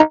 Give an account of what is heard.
Instrument samples > Synths / Electronic
TAXXONLEAD 8 Eb
fm-synthesis, bass, additive-synthesis